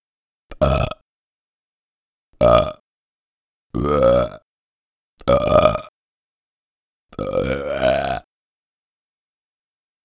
Sound effects > Human sounds and actions
5 cartoon burps
mouth, male, burp, drunk, man, human, cartoon, voice